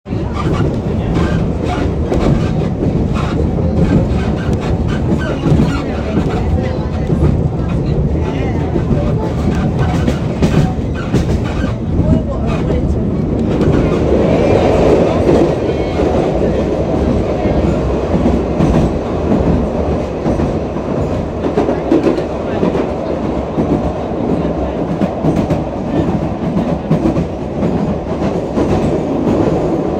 Sound effects > Other mechanisms, engines, machines
inside a train
people, railway, train